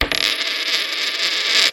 Sound effects > Objects / House appliances
A nickel dropping and spinning.